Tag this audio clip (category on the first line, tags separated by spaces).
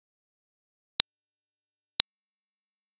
Sound effects > Other
Flag Preview Belgium Freesound20 Experimental Belgique